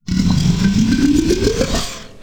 Sound effects > Experimental
Creature Monster Alien Vocal FX (part 2)-054
Alien, bite, Creature, demon, devil, dripping, fx, gross, grotesque, growl, howl, Monster, mouth, otherworldly, Sfx, snarl, weird, zombie